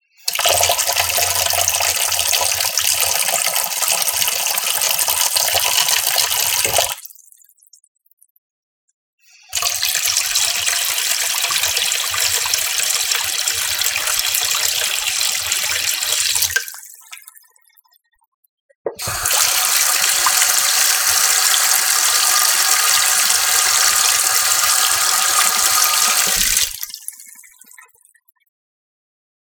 Sound effects > Objects / House appliances
tap-water-running
Continuous flow of water from a faucet. Recorded with Zoom H6 and SGH-6 Shotgun mic capsule.